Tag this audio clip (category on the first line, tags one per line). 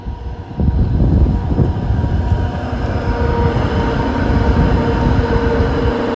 Sound effects > Vehicles
Tampere
field-recording
tram